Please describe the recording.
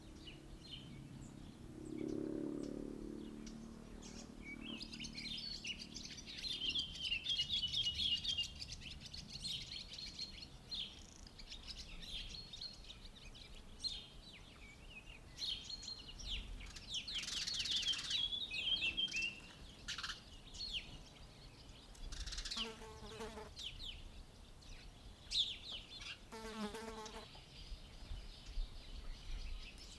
Soundscapes > Urban
250427-15h41 Gergueil Ambience
Subject : Afternoon Ambience of Gergueil Date YMD : 2025 04 27 15h41 Location : Gergueil France. Hardware : Zoom H5 stock XY capsule. Weather : Processing : Trimmed and Normalized in Audacity.
April, Gergueil, Outdoor, Rural, Village